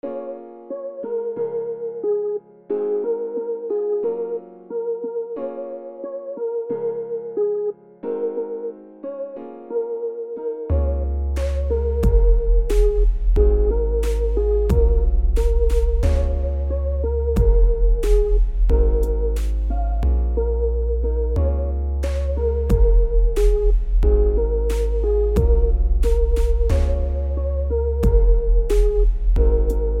Music > Multiple instruments
Sunshine in the Dawn Mist
A relaxing morning BGM.
piano, background, atmosphere, relax